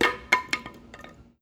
Sound effects > Objects / House appliances
WOODImpt-Samsung Galaxy Smartphone, CU Board Drop 01 Nicholas Judy TDC
A wooden board drop.
board, drop, Phone-recording, wooden